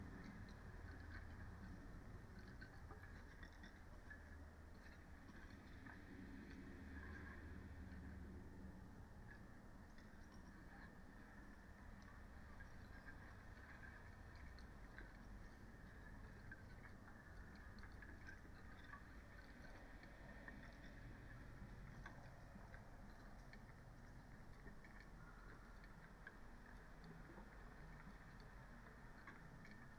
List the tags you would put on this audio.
Soundscapes > Nature
artistic-intervention
modified-soundscape
alice-holt-forest
phenological-recording
soundscape
nature
sound-installation
data-to-sound
natural-soundscape
raspberry-pi
weather-data
field-recording
Dendrophone

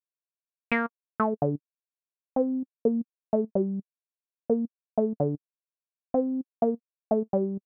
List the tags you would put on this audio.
Music > Solo instrument
electronic
house

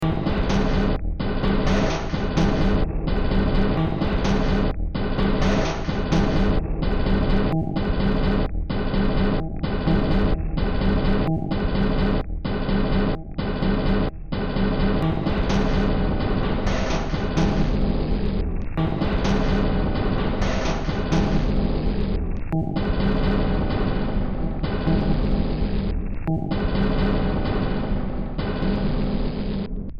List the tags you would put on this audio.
Music > Multiple instruments
Horror
Industrial
Noise
Soundtrack
Underground

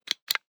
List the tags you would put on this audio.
Sound effects > Human sounds and actions
switch
toggle
activation
off
button
click
interface

